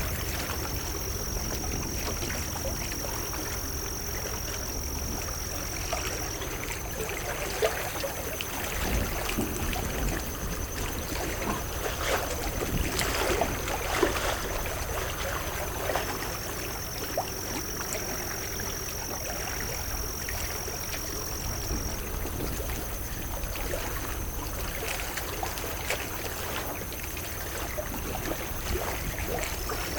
Soundscapes > Nature
Early morning on Oyster bay, small waves lapping against boardwalk at edge of marsh, summer, 6:15AM